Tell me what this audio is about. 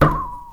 Sound effects > Other mechanisms, engines, machines
Handsaw Pitched Tone Twang Metal Foley 4
Handsaw fx, tones, oneshots and vibrations created in my workshop using a 1900's vintage hand saw, recorded with a tascam field recorder